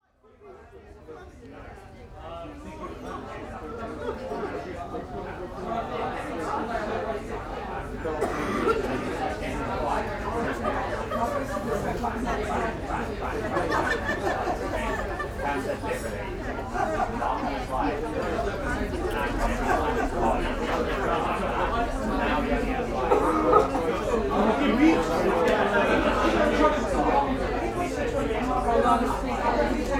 Soundscapes > Urban
A recording at a outdoor cafe.